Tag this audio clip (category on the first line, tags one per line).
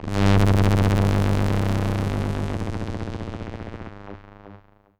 Sound effects > Experimental
sci-fi
robot
mechanical
snythesizer
scifi
weird
oneshot
bass
trippy
machine
analogue
dark
sfx
sweep
robotic
bassy
fx
electro
analog
retro
pad
complex
synth
alien
korg
basses
electronic
effect
sample
vintage